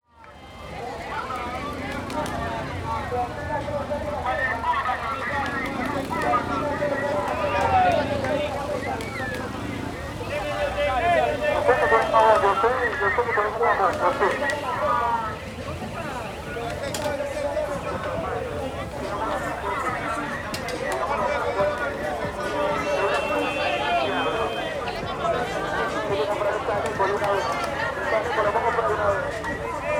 Soundscapes > Urban
Several vendors' voices amplified by megaphones and car horns.